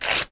Sound effects > Objects / House appliances
A clap-like paper rip.